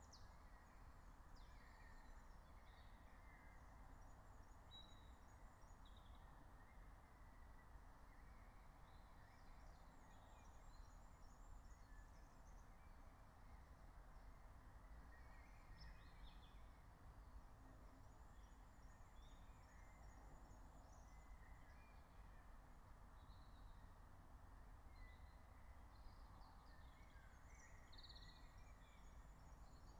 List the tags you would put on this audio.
Soundscapes > Nature
field-recording
alice-holt-forest
nature
phenological-recording
soundscape

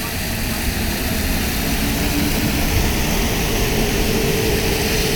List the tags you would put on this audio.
Sound effects > Vehicles
vehicle
bus
transportation

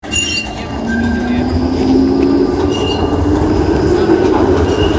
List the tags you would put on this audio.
Sound effects > Vehicles
field-recording
Tampere

